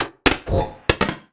Sound effects > Electronic / Design
SFX MagicReload-03
Whatever bullet you loaded sounds like it's got some extra spice to it. Magic, perhaps? Variation 3 of 4.